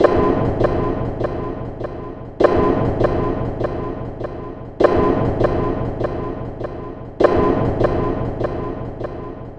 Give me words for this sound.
Instrument samples > Percussion
This 200bpm Drum Loop is good for composing Industrial/Electronic/Ambient songs or using as soundtrack to a sci-fi/suspense/horror indie game or short film.
Industrial, Packs, Alien, Loop, Dark, Weird, Loopable, Samples, Drum, Ambient, Underground, Soundtrack